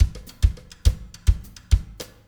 Music > Solo percussion
I just had some fun coming up with a few ideas on my drum kit. I used a Mapex Armory kit with tom sizes of 10", 12", 14", a kick with 20" and a snare with 14"x5,5".